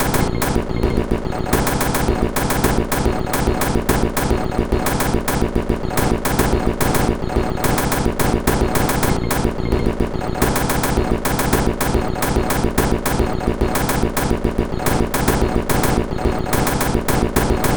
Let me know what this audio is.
Instrument samples > Percussion
This 108bpm Drum Loop is good for composing Industrial/Electronic/Ambient songs or using as soundtrack to a sci-fi/suspense/horror indie game or short film.
Loopable, Weird, Industrial, Drum, Underground, Samples, Ambient, Loop, Dark, Packs, Soundtrack, Alien